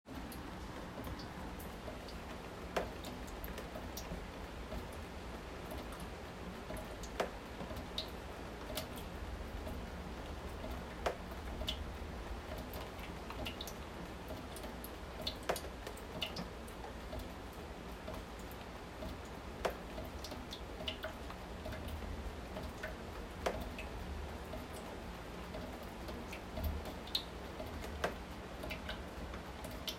Soundscapes > Nature
Guatapé gotera
This was recorded with my IPhone on a rainy night in a small town near Medellin, Colombia.